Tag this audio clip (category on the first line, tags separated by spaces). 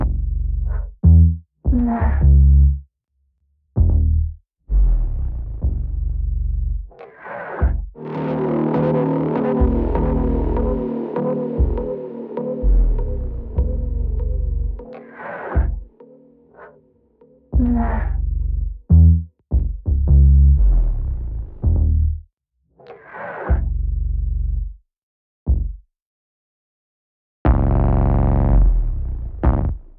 Instrument samples > Synths / Electronic
bass electro electronic loop synth techno